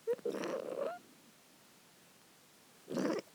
Sound effects > Animals
The kitten makes a pleasant sound. Recorded on the phone.
kitty, recorder, animal, cat